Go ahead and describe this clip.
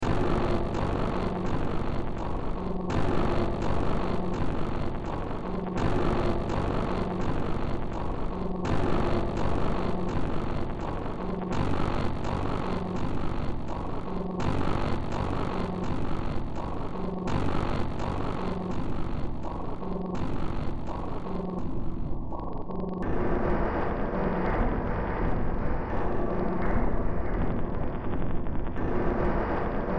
Music > Multiple instruments
Demo Track #3079 (Industraumatic)
Noise, Cyberpunk, Games, Sci-fi, Industrial, Horror, Underground, Ambient, Soundtrack